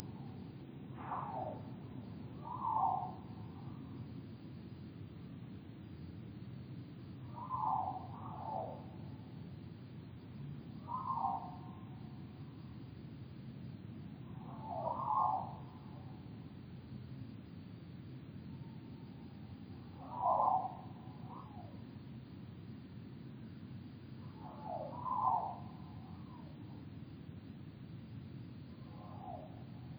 Sound effects > Experimental
Quarter Speed Sparrows NR
Sound of sparrows slowed down to 25% speed. Some noise reduction applied in Audacity to remove background aircraft and traffic noise.
animals, Dare2025-05, FX, lo-fi, scary, slow-motion, sound-design